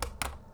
Sound effects > Objects / House appliances
A retractable pen setting down on a desk.